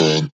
Speech > Solo speech
Random Brazil Funk Volcal Oneshot 3
Recorded with my Headphone's Microphone, I was speaking randomly, I even don't know that what did I say，and I just did some pitching with my voice. Processed with ZL EQ, ERA 6 De-Esser Pro, Waveshaper, Fruity Limiter.
Acapella, BrazilFunk, Vocal